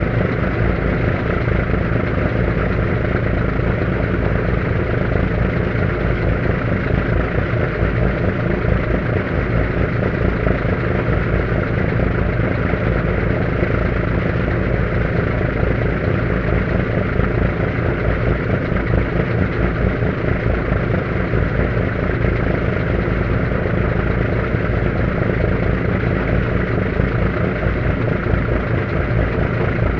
Sound effects > Other mechanisms, engines, machines
Ferryboat engine. Recorded between Batangas city and Calapan city (Philippines), in August 2025, with a Zoom H5studio (built-in XY microphones). Fade in/out applied in Audacity.